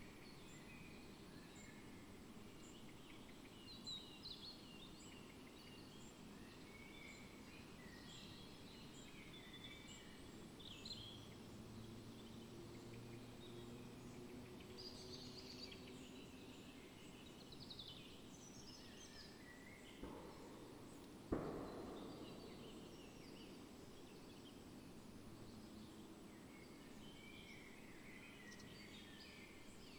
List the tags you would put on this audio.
Soundscapes > Nature
weather-data artistic-intervention raspberry-pi alice-holt-forest natural-soundscape data-to-sound field-recording sound-installation modified-soundscape Dendrophone nature phenological-recording soundscape

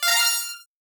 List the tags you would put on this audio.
Sound effects > Electronic / Design
coin designed game-audio high-pitched pick-up tonal

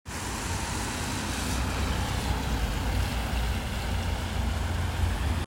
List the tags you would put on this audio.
Sound effects > Vehicles
rain tampere vehicle

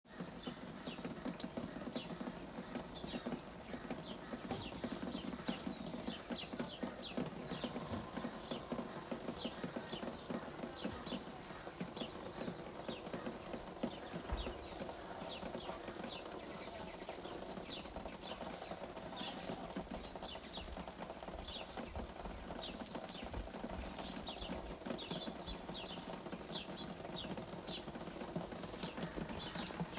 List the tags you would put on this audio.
Soundscapes > Nature
drizzle field-recordings voices

